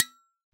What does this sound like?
Sound effects > Objects / House appliances
Solid coffee thermos-010
sampling, percusive, recording